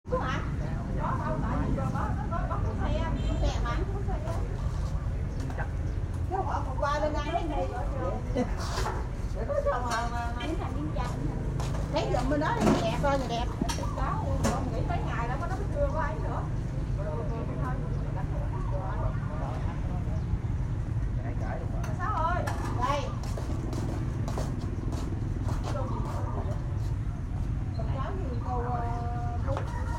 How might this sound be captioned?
Soundscapes > Urban
Chợ Mương Trâu Sáng - Market Morning
Sound in market Chợ Mương Trâu in morning. Record use iPhone 7 Plus 2024.11.27 06:24
sell, market, crowd, people